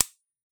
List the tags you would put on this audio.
Sound effects > Other mechanisms, engines, machines
click foley percusive recording sampling

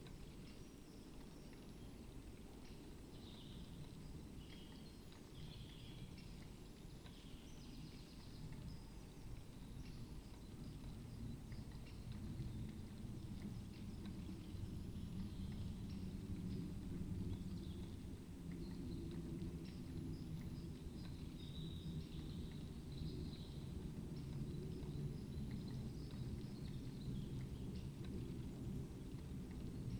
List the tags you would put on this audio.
Soundscapes > Nature
modified-soundscape artistic-intervention data-to-sound phenological-recording raspberry-pi soundscape weather-data sound-installation field-recording alice-holt-forest natural-soundscape Dendrophone nature

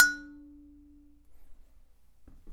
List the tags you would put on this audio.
Sound effects > Objects / House appliances
fx sfx drill industrial mechanical metal stab hit percussion fieldrecording oneshot perc clunk glass natural object bonk foley foundobject

